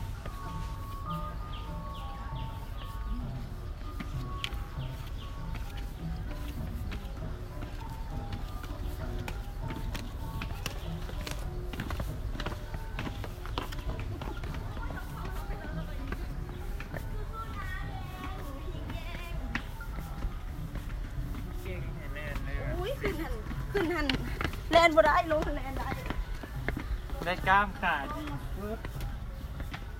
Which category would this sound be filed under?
Soundscapes > Urban